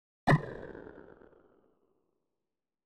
Sound effects > Electronic / Design
SHARP ARTIFICIAL ELECTRIC CLICK
EXPERIMENTAL, SYNTHETIC, SHARP, OBSCURE, HARSH, COMPUTER